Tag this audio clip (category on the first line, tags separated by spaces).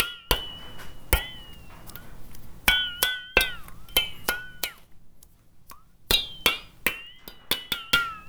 Sound effects > Other mechanisms, engines, machines
foley fx handsaw hit household metal metallic perc percussion plank saw sfx shop smack tool twang twangy vibe vibration